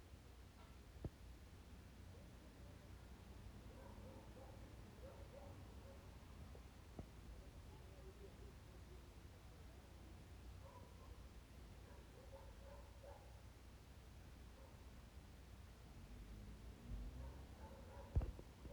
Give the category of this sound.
Soundscapes > Urban